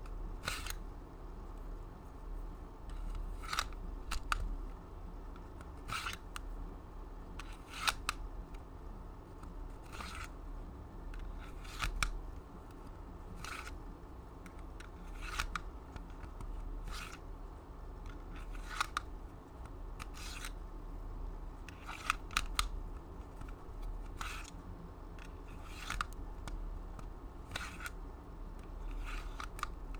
Sound effects > Objects / House appliances
GAMEVideo-Blue Snowball Microphone, MCU Nintendo, DS, Stylus, Slide In, Out of Console Nicholas Judy TDC
A Nintendo DS stylus sliding in and out of console.